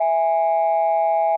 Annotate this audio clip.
Instrument samples > Synths / Electronic
I was messing around in FL Studio using a tool/synth called Fluctus. It's basically a synth which can produce up to 3 concurrent tones. With two sine waves, the second of which tuned to a just-intuned minor 3rd (386 cents) above the first tone, each tone makes a sort of "holding tone" that is reminiscent of land-line phones.